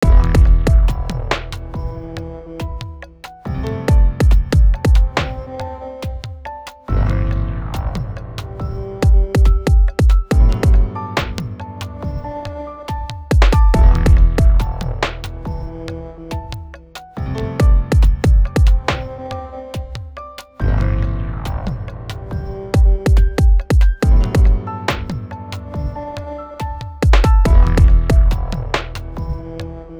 Music > Multiple instruments
Piano Sus Melody Triphop Beat 140bpm
A chill piano melody and drum beat loop I created using FL studio and processed with Reaper
140bpm, bass, beat, beatloop, chill, contemplative, downtempo, drum, drumloop, drums, gangster, hip, hiphop, hop, key, keyloop, keys, loop, loopable, melody, perc, percloop, percussion, piano, pianoloop, rap, sus, suspended, synthloop, triphop